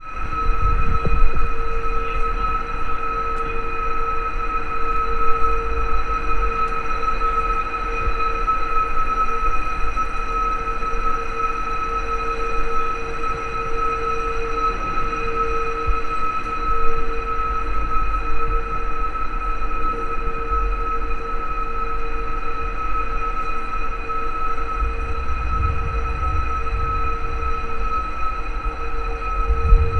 Soundscapes > Urban
AMBUrbn-Contact Mic street noise near a lamppost SoAM Sound of Solid and Gaseous Pt 1
There is one sound of my first test field recording Library "Sound of Solid and Gaseous Pt.1" with Zoom H4e and Contact mic by IO Audio. Wish it will be useful! Record_by_Sound_of_Any_Motion SoAM
contact, metal